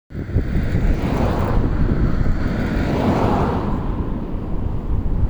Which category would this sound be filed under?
Sound effects > Vehicles